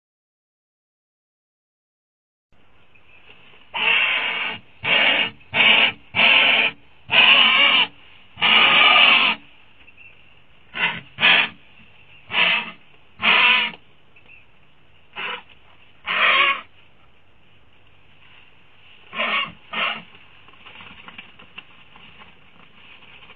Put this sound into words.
Sound effects > Animals
Condor loudly screeching/growling from a nest.